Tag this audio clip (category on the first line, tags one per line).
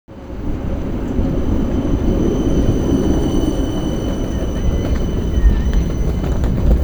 Sound effects > Vehicles
tram
vehicle
rail